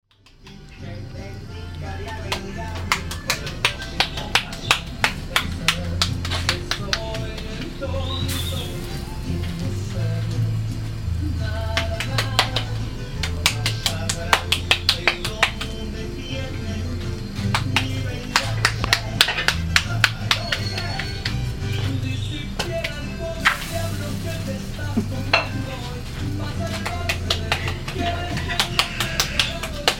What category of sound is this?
Soundscapes > Other